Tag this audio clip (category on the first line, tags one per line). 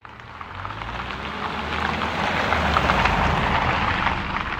Sound effects > Vehicles
driving
car